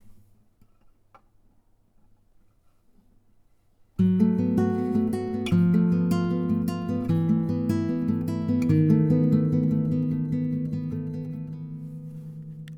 Music > Solo instrument
Intermediate arpeggio for theme in G major
arpeggio, classic, Gmajor